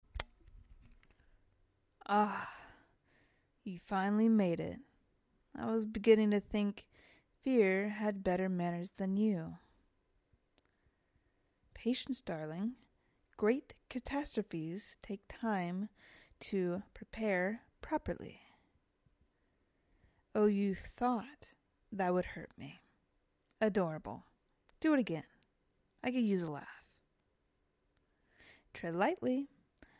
Speech > Solo speech
Character Voice Pack: Smooth-Talking Villain (slick / smug / charming but dangerous)
A slick, sinister voice pack for a charismatic villain. Ideal for cutscenes, audio dramas, or antagonists with flair. 1. Greeting / Introduction “Ahh… you finally made it. I was beginning to think fear had better manners than you.” 2. Idle / Casual Line “Patience, darling. Great catastrophes take time to prepare properly.” 3. Mocking / Taunting “Oh, you thought that would hurt me? Adorable. Do it again—I could use a laugh.” 4. Annoyed / Cold “Tread lightly. I only smile before the screaming starts.” 5. Command / Threat “Leave the room. And if you value your fingers, don’t touch a thing on your way out.” 6. Victory / Satisfied “It’s done. All the little pieces fell exactly where I placed them. You were never playing the game. You were the game.”
voiceover, darkdialogue, script, smoothoperator, villainvoice